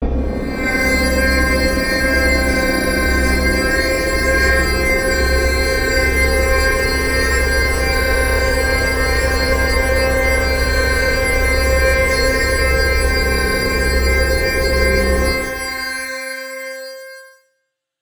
Instrument samples > Synths / Electronic
Synth Ambient Pad note C4 #006
Synth ambient pad with a slow atmospheric pad sound. Note is C4